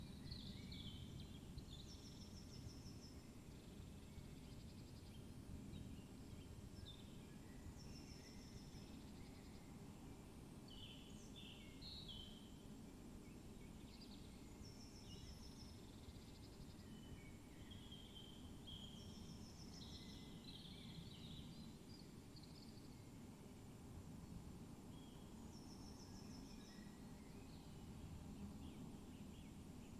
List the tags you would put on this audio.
Soundscapes > Nature
raspberry-pi sound-installation weather-data field-recording artistic-intervention nature natural-soundscape phenological-recording alice-holt-forest data-to-sound Dendrophone soundscape modified-soundscape

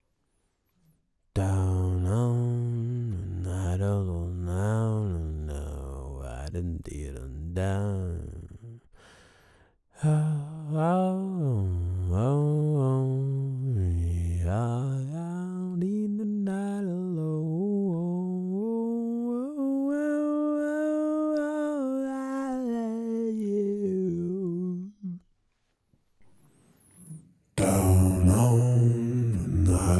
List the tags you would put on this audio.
Music > Other
human male fx weird sing sample vocal freaky vocalist voice music alien 87bpm

